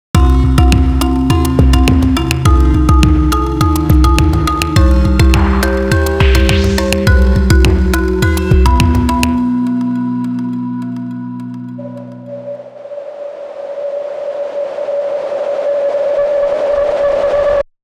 Music > Multiple instruments
drumloop loop beat drums drum bass hip hop hiphop industrial trippy glitch glitchy fx